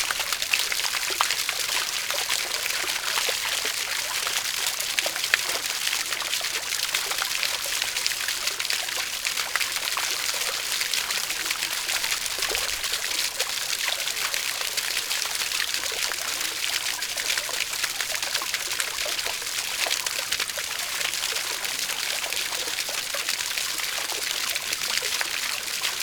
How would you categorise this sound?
Sound effects > Natural elements and explosions